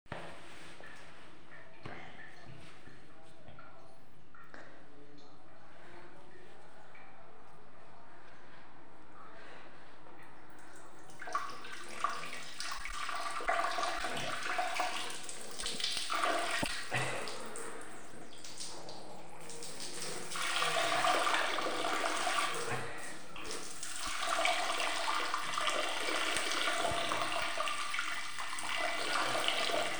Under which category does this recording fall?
Sound effects > Natural elements and explosions